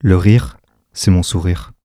Speech > Solo speech
Le rire cest mon sourir
Subject : Mid 20s male, saying "Laughing is my smile" in French. Just a cheesy phrase I came up with. Kind of in line with "J'adore rire" meme. Date YMD : 2025 June 14 Location : Albi 81000 Tarn Occitanie France. Hardware : Tascam FR-AV2, Shure SM57 with A2WS windcover Weather : Processing : Trimmed in Audacity.
francais, 20s, french, vocal, FRAV2, male, FR-AV2, one-shot, mid-20s, Sm57, human, Tascam, A2WS